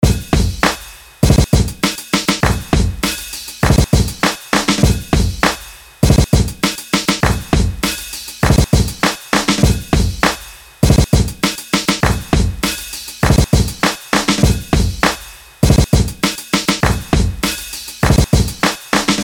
Music > Other
Drum Loop 100 BPM kinda old school
Made this in Fl Studio using random old school type samples. The tempo is 100 BPM.
110, beat, bpm, drum, drums, loop, old, retro, school, tempo